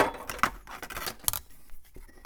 Sound effects > Other mechanisms, engines, machines
metal shop foley -109
bam, bang, boom, bop, crackle, foley, fx, knock, little, metal, oneshot, perc, percussion, pop, rustle, sfx, shop, sound, strike, thud, tink, tools, wood